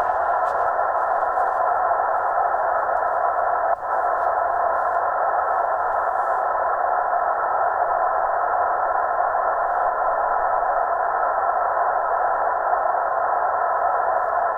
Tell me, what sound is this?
Sound effects > Other mechanisms, engines, machines
Radio noise on short waves without signals.
Recording of the broadcast by the receiver CW mode, reception bandwidth of 2.4 kHz. No signal, just noise
interferences, receiver, wave, electronic, radio, noise, shot, broadcast, crackling